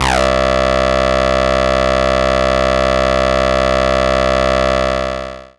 Instrument samples > Synths / Electronic
Acid Lead One Shoot 1( C Note)
Synthed from phasplant
Lead, OneShoot, Acid, 303